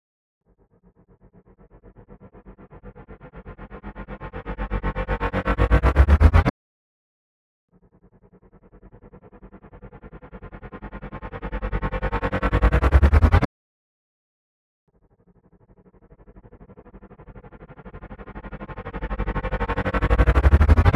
Sound effects > Electronic / Design
unfa tremolo riser braam
powerup, electronic, sci-fi, tremolo, bionic, heaven, heavens, electro, attack, reversed, magic, riser, cinematic, processed, anime, space, transformers, braam, fate